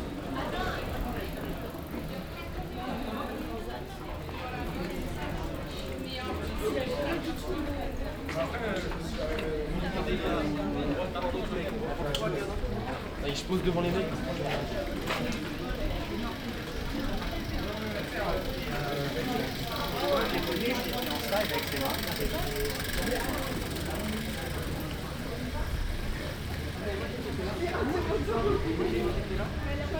Soundscapes > Urban
250609 11h02 Albi Rue du Castelviel to Cathedral - Walkthough OKM1
Subject : Walking from the Castelviel street towards the Cathedral. Date YMD : 2025 06 09 (Monday) 11h02 Location : Pratgraussal Albi 81000 Tarn Occitanie France. Outdoors Hardware : Tascam FR-AV2, Soundman OKM1 Binaural in ear microphones. Weather : Clear sky 24°c ish, little to no wind. Processing : Trimmed in Audacity. Probably a 40hz 12db per octave HPF applied. (Check metadata) Notes : That day, there was a triathlon going on.
2025, 81000, Albi, bicycle, Binaural, City, FPV, France, FR-AV2, In-ear-microphones, ITD, june, monday, motorbike, Occitanie, OKM, OKM-1, OKM1, Outdoor, people, Soundman, Tarn, Tascam, walk, walking, walkthough, walk-through